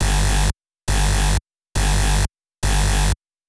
Sound effects > Electronic / Design
03 - Alarms & Beeps - Urgency Is Key B
Aggressive synthesised alarm sound.
alarm, alert, beep, electronic, sci-fi, scifi, UI